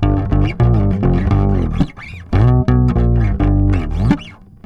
Instrument samples > String
electric bass guitar riffs, chords melodies, ideas, loops
slide, rock, riffs, loops, charvel, funk, loop, bass, plucked, mellow, fx, pluck, oneshots, electric, blues